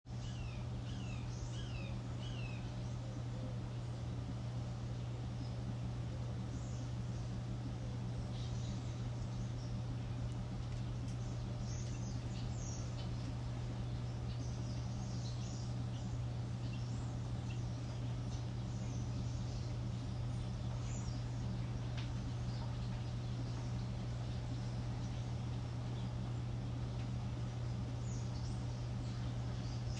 Soundscapes > Nature
Dryer, starling, red shoulder hawk and eve breezes 07/20/2022
Dryer, starling, red shoulder hawk and eve breezes
dryer, nature, red-shoulder-hawk, countryside, field-recording, starling, rural, birds, field-recordings